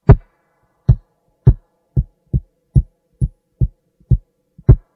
Sound effects > Experimental
Plastic Comb Kicks
Less processed version of recording of plastic comb, kick drum like sound
recorded, sample, deep, techno